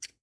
Sound effects > Objects / House appliances
OBJMisc-Samsung Galaxy Smartphone, CU Pocket Knife, Close Nicholas Judy TDC
A pocket knife closed.
close, foley, Phone-recording